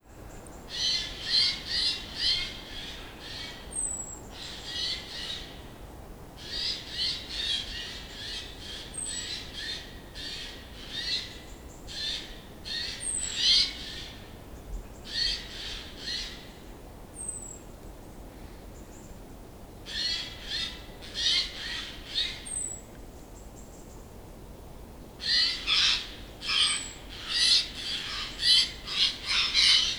Soundscapes > Nature
A heron in the Foix River Park, Baix Penedès, Catalonia, Spain. Recorded with a ZOOM H2n recorder.